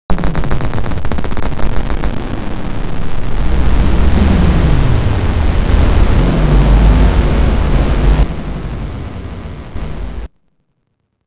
Sound effects > Electronic / Design
Optical Theremin 6 Osc ball infiltrated-004
Alien, Analog, Bass, Digital, DIY, Dub, Electro, Electronic, Experimental, FX, Glitch, Glitchy, Handmadeelectronic, Infiltrator, Instrument, Noise, noisey, Optical, Otherworldly, Robot, Robotic, Sci-fi, Scifi, SFX, Spacey, Sweep, Synth, Theremin, Theremins, Trippy